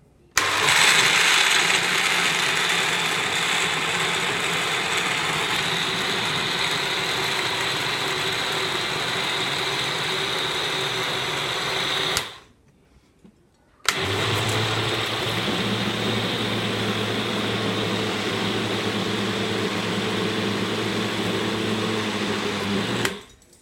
Sound effects > Objects / House appliances
Máy Xay Sinh Tố - Blender
Blender sound. Record use iPad 2, 2025.08.04 11:05